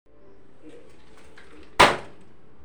Sound effects > Other
Closing plastic door
Closing door sound effect. Recorded with mobile phone.
close, door, modern, plastic